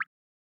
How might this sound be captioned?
Sound effects > Objects / House appliances
Drop PipetteDripFast 1 SFX
water, pipette, drip, drop